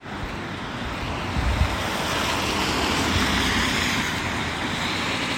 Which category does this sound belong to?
Sound effects > Vehicles